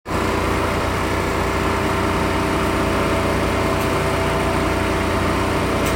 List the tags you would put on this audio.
Soundscapes > Urban
bus bus-stop traffic

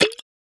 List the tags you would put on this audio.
Sound effects > Objects / House appliances
shake water mason-jar

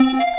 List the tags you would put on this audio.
Sound effects > Electronic / Design
alert menu

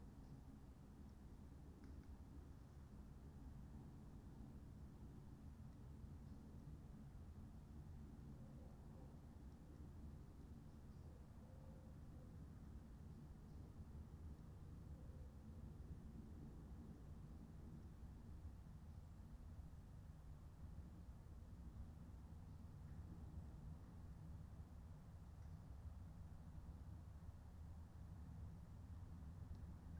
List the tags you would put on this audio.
Soundscapes > Nature
natural-soundscape Dendrophone data-to-sound modified-soundscape sound-installation artistic-intervention raspberry-pi weather-data field-recording phenological-recording soundscape nature alice-holt-forest

